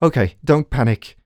Speech > Solo speech
Fear - okay dont panic
Neumann, ok, Video-game, Voice-acting, voice, Male, singletake, fear, Single-take, okay, U67, Mid-20s, NPC, talk, oneshot, FR-AV2, Man, Tascam, Human, scared, Vocal, dialogue